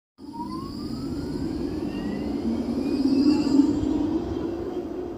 Soundscapes > Urban
final tram 31
finland, tram, hervanta